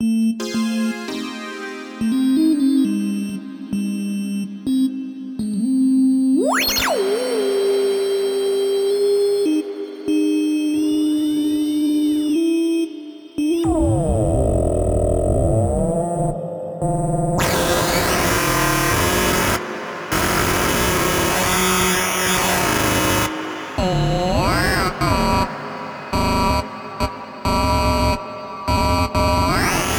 Music > Other
Stoned Clangers
These guys always show up at the end of my synth jams. Dark Energy analog synth with audio rate modulation of filter frequency and oscillator pulse width. There are a couple of chords from Blofeld at the start, at the end I turn down the audio rate modulation leaving a saw wave mixed with pulse wave.
fm, weird, drone, dark-energy, analog, music, reverb, noise, pwm, audio-rate-modulation, electronic, synthesizer, synth